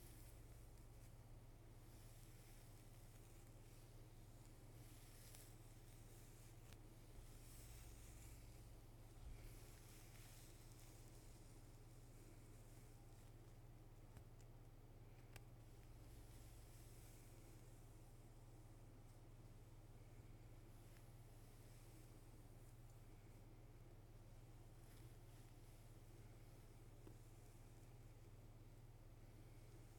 Sound effects > Human sounds and actions
I run my fingers through my long hair to get that petting sound